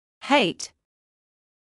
Speech > Solo speech
to hate
english, pronunciation, voice